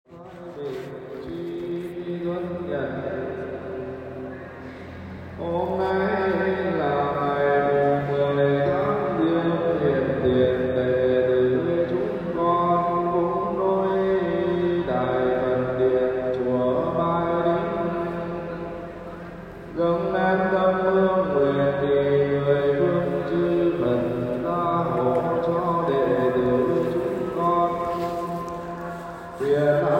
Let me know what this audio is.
Soundscapes > Other
Buddist Temple - Bai Dinh Pagoda, Vietnam
Recording of a buddist monk singing in Bai Dinh Temple, Vietnam. 7/1/25